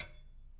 Sound effects > Objects / House appliances
knife and metal beam vibrations clicks dings and sfx-131
Beam, Clang, ding, Foley, FX, Klang, Metal, metallic, Perc, SFX, Trippy, Vibrate, Vibration, Wobble